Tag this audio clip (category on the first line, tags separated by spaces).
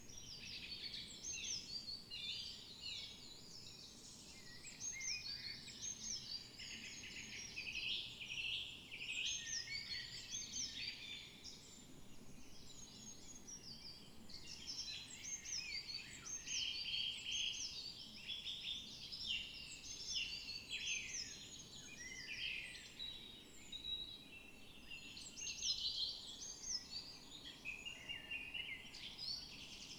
Soundscapes > Nature
Dendrophone natural-soundscape artistic-intervention field-recording weather-data phenological-recording raspberry-pi nature modified-soundscape soundscape alice-holt-forest data-to-sound sound-installation